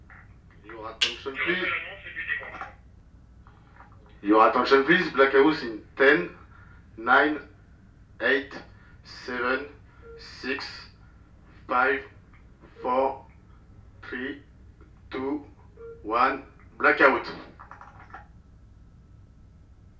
Soundscapes > Indoors
Blackout Announcement – Saint-Nazaire Shipyard Field recording captured at the port of Saint-Nazaire, France, during the scheduled “blackout” at a cruise-ship construction site. Recorded on a Zoom H5 with the XY stereo capsule. Subtle reverb from the metal structures gives the voice a haunting, resonant quality. Use this sound for: industrial scenes, documentary moments, shipyard atmospheres, or any project needing the texture of a live announcement in a large acoustic space.